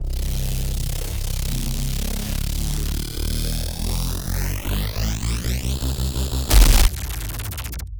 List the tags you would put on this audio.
Sound effects > Other
railgun futuristic laser weapon space scifi gun sci-fi electronic rifle

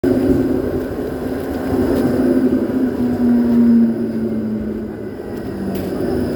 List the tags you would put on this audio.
Sound effects > Vehicles
slow stop tram

